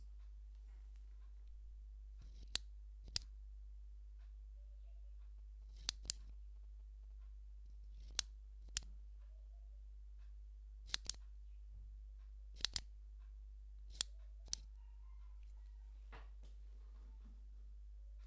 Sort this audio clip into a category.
Sound effects > Other